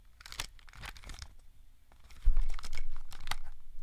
Sound effects > Objects / House appliances
Tape Recorder Rustle
The rustle of handling a small handheld Sony M-470 micro-cassette tape recorder, actively loaded with a tape.
electronics, handling, rattle, rustle, tape